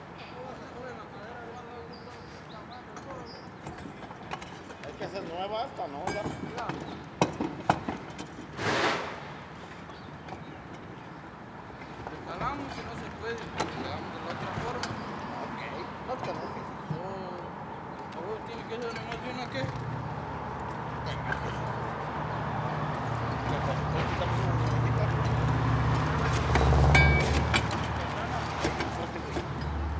Soundscapes > Urban
A roofing crew scraping all the old shingles off of a roof.